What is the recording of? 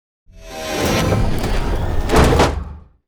Sound effects > Other mechanisms, engines, machines
Sound Design Elements-Robot mechanism SFX ,is perfect for cinematic uses,video games. Effects recorded from the field.